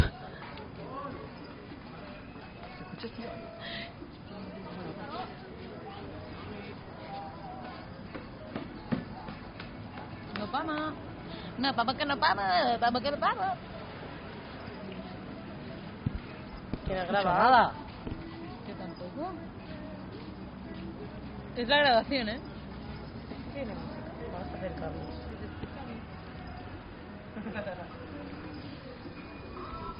Sound effects > Human sounds and actions
20250326 JardiJaponesCanFabra3 Nature Music Humans Steps Nice Energetic
Energetic, Humans, Music, Nature, Nice, Steps